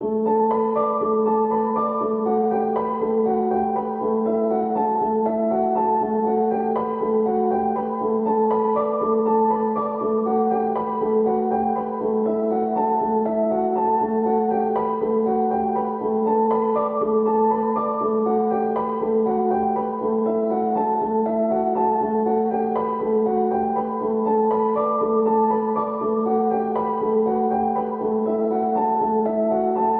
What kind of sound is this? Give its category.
Music > Solo instrument